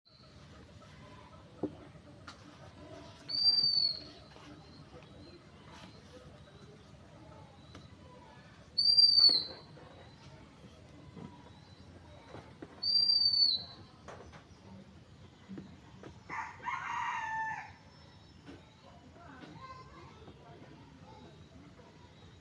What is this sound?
Sound effects > Animals
Magpie Bird sound
This is a magpie sound recorded with mobile.
magpie, bird, chirping